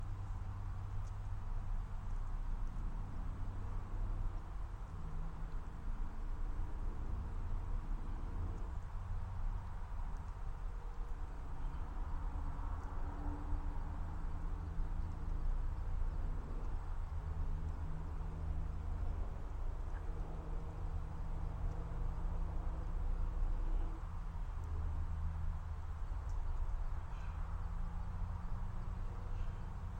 Soundscapes > Urban
Nearby Industrial Highway
Taken in a small trench in a nature walk nearby a busy highway with heavy trucks and trains passing through.
Machinery, Highway